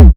Instrument samples > Percussion
BrazilFunk Kick 32
Synthed with phaseplant only. Well, it actually is a failed botanica bass I made with Vocodex FX. But I try to save it with different way, so I put it in to FL studio sampler to tweak pitch, pogo and boost randomly. Yup, a stupid sample.
Distorted, Kick, BrazilFunk, Sub, Subsive